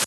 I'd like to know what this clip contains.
Instrument samples > Synths / Electronic
A databent closed hihat sound, altered using Notepad++